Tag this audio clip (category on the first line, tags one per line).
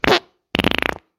Sound effects > Other
fart
flatulence